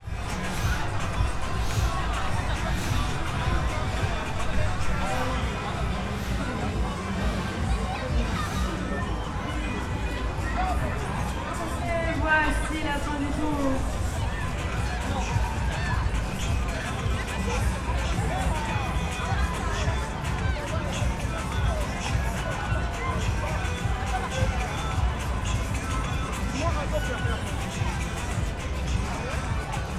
Soundscapes > Urban
250424 174434 FR Funfair atmosphere in Paris
Funfair atmosphere in Paris, France. I made this recording standing in a famous funfair called ‘’la Foire du Trône’’, taking place in eastern Paris (France), every year during late spring. One can hear people (kids and adults) chatting and rambling between the fairground rides broadcasting music and sound effects through loudspeakers. Recorded in April 2025 with a Zoom H6essential (built-in XY microphones). Fade in/out applied in Audacity.
children, attraction, soundscape, ride, crowd, fun, noise, roller-coaster, machines, funfair, France, kids, atmosphere, people, teen-agers, fairground, field-recording, machine, ambience, voices, noisy, lively, Paris, walla, amusement-park, scream, rides, music, general-noise, rollercoaster